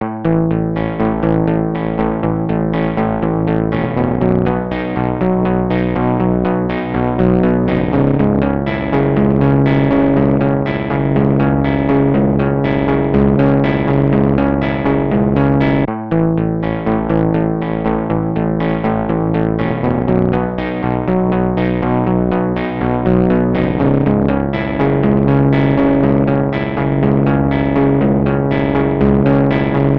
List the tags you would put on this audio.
Music > Solo instrument
reverb simplesamples samples free bpm music guitar electricguitar electric pianomusic simple loop

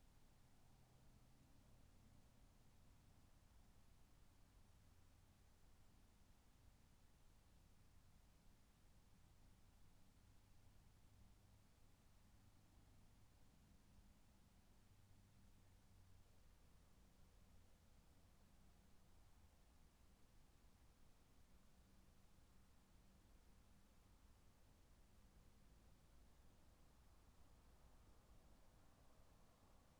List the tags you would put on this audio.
Soundscapes > Nature
sound-installation raspberry-pi modified-soundscape Dendrophone soundscape nature weather-data phenological-recording artistic-intervention natural-soundscape alice-holt-forest field-recording data-to-sound

